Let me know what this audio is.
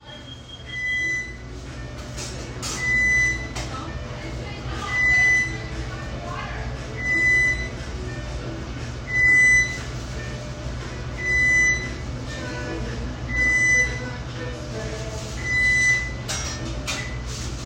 Sound effects > Other mechanisms, engines, machines
Commercial Restaurant Oven Alarm
Oven alarm beeping at a Taco Bell restaurant in the kitchen. Hustle and bustle of the general atmosphere can also be heard.